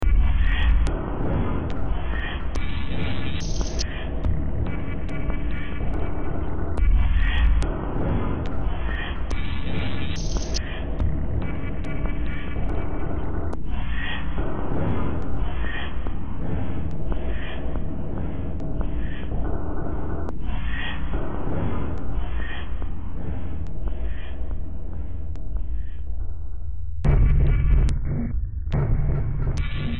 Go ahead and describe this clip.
Music > Multiple instruments
Demo Track #4039 (Industraumatic)
Cyberpunk, Sci-fi, Noise, Horror, Underground, Industrial, Soundtrack, Games, Ambient